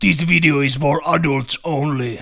Speech > Solo speech
This video is for adults only
Audio Announcement: Age Restriction